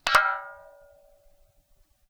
Sound effects > Experimental
contact mic in metal thermos, clang
contact-mic, water, experimental, thermos, water-bottle, contact-microphone